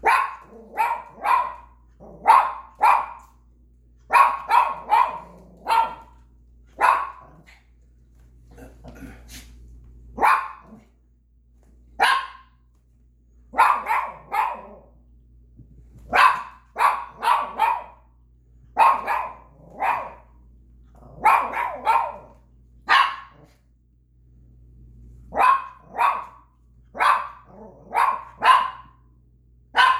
Sound effects > Animals
ANMLDog-Samsung Galaxy Smartphone, CU Cavalier Poodle, Barking Nicholas Judy TDC
A cavalier poodle barking. Performed by Kylie's dog, Umbral. Also useful for a puppy.